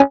Instrument samples > Synths / Electronic
TAXXONLEAD 8 Db
additive-synthesis, bass, fm-synthesis